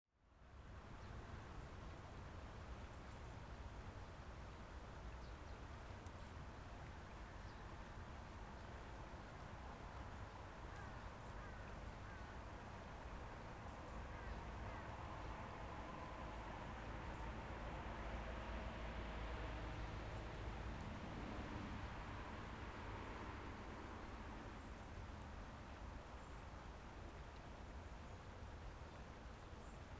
Soundscapes > Nature
Town Ambiance
Capturing the ambiance on a sunday morning in a small town. Location is close to a river bank which it can be heard and a church nearby, throughout this clip the ambiance slightly changes because of the sound recorders reposition. in this clip you can hear: #1:18 church bell #2:49 train in the distance #8:20 stranger passing by on a gravel path #11:50 & #21:00 heavy farming machinery and finishing with another church bell.
cars, machinery, ambiance, distant, morning